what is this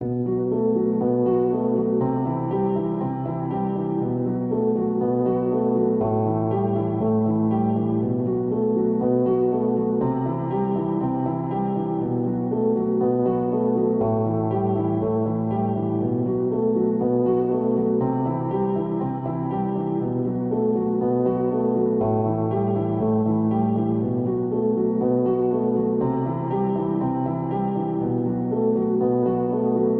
Music > Solo instrument
Piano loops 062 efect 4 octave long loop 120 bpm
120, 120bpm, music, reverb, samples